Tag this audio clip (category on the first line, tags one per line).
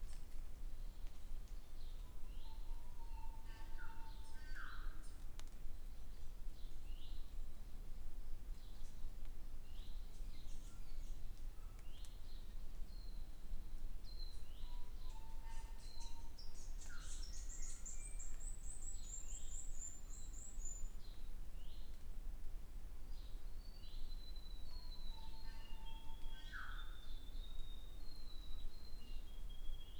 Soundscapes > Nature
Birds
Bush
Dripping
Evening
Fantail
Field-Recording
Forest
Gerygone
Invercargill
Nature
New
Song
Tui
Wet
Zealand